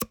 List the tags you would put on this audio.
Sound effects > Other
cards game interface playing ui

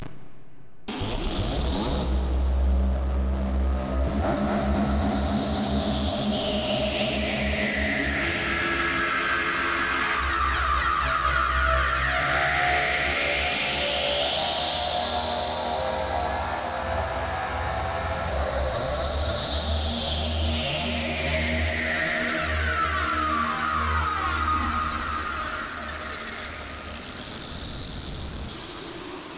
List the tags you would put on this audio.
Soundscapes > Synthetic / Artificial
ambience ambient bass bassy drone effect evolving low roar synthetic wind

